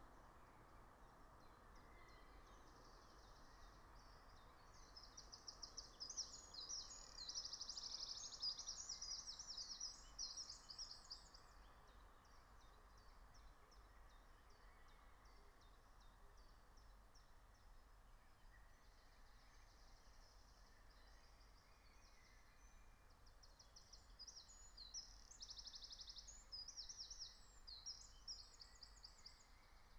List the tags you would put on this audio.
Soundscapes > Nature
nature raspberry-pi soundscape meadow alice-holt-forest phenological-recording natural-soundscape field-recording

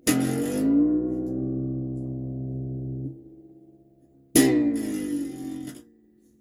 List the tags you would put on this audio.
Sound effects > Other
pitch guitar low bass Phone-recording

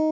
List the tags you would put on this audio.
Instrument samples > String
stratocaster tone guitar arpeggio design cheap sound

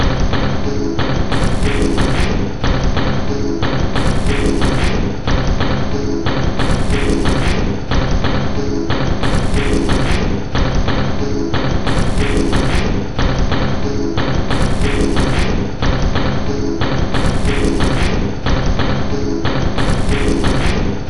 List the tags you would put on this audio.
Instrument samples > Percussion
Loopable Ambient Industrial Packs Soundtrack Weird Loop Drum Underground Dark Alien

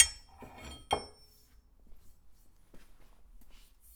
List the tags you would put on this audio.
Sound effects > Other mechanisms, engines, machines
rustle perc pop percussion thud foley crackle sfx strike oneshot bang shop fx bam metal tink knock little wood tools sound boom bop

